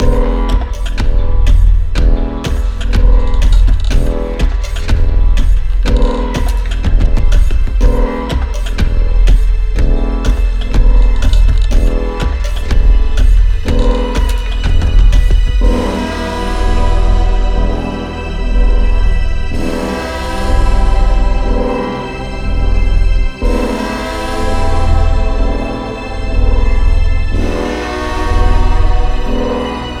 Music > Multiple instruments
Trippy extended TV soundscape built from Spectrasonics Omnisphere Sonic Expansion “Twisted Trees” — natural tree recordings layered into a slow build that later adds simple drums and a bassline as a pointer to full song direction. It opens with a Jungle Gym kick-like root drum, then a second channel of higher-EQ hallucinogenic wood percussion shaped with CLA-3A, tight transient control, and short, nervous echoes for tension. An organic analogue pad and the Beachwood flute from Twisted Trees swell in with slow attack and long release, while Ablation’s built-in Diffused Cascades thickens the image, widens mid–side space, and gels the layers. When the groove arrives, the drums and acid-leaning bassline sit inside the forested texture rather than on top, maintaining movement and atmosphere while signalling the arrangement path. Master bus: Waves Abbey Road Mastering Chain into L2, true-peak ceiling at −0.3 dB.
123bpm, free, griffin, soundscape, trees, trippy, twisted